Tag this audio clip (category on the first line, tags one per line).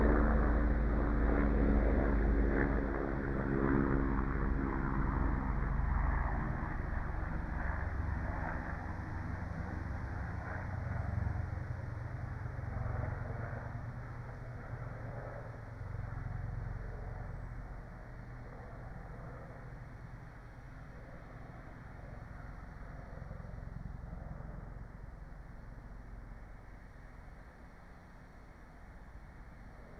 Soundscapes > Nature
alice-holt-forest
artistic-intervention
data-to-sound
field-recording
modified-soundscape
natural-soundscape
nature
phenological-recording
soundscape